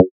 Instrument samples > Synths / Electronic
FATPLUCK 8 Gb
bass, additive-synthesis, fm-synthesis